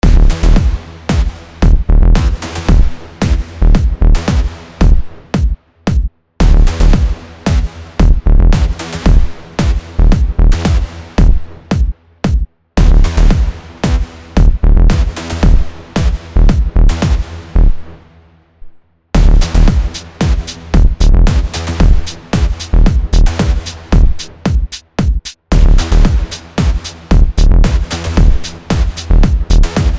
Music > Multiple instruments
Ableton Live. VST........Nexus....Musical Composition Free Music Slap House Dance EDM Loop Electro Clap Drums Kick Drum Snare Bass Dance Club Psytrance Drumroll Trance Sample .
Bass, Clap, Dance, Drum, Drums, EDM, Electro, Free, House, Kick, Loop, Music, Slap, Snare